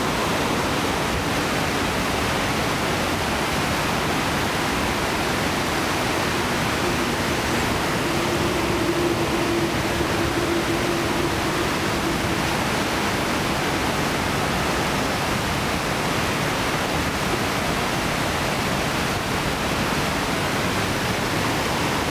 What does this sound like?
Soundscapes > Nature
Mapocho River 8516
Recording of the Mapocho River made in downtown Santiago, Chile, with a Zoom H4n Pro. Water about ten meters away; very fast and noisy current.
Mapocho, River, Santiago, Water